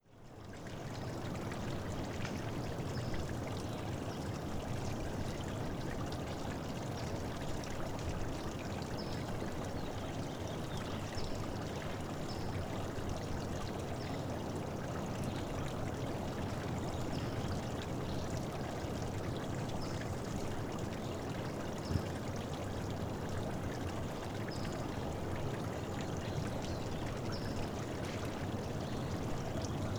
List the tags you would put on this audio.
Soundscapes > Nature
field-recording
birds
water

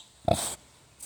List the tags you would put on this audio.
Sound effects > Animals
hog
pig
pumbaa
warthog
africa
wild
safari

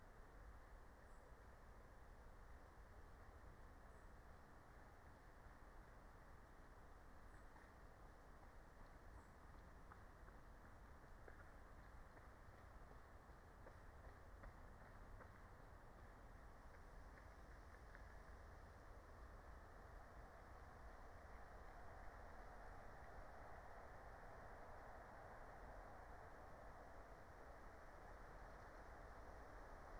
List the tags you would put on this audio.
Soundscapes > Nature
field-recording
alice-holt-forest
soundscape
nature
phenological-recording
meadow
natural-soundscape
raspberry-pi

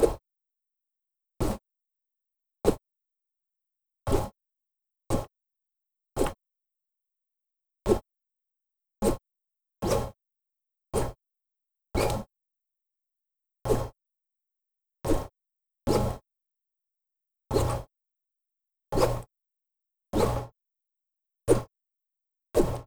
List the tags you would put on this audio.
Sound effects > Objects / House appliances
Blue-brand
Blue-Snowball
foley
low
medium
swish

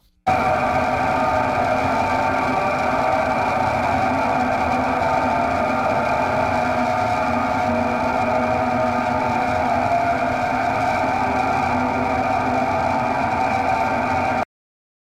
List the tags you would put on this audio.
Sound effects > Experimental
buzzy
craze
oscillator

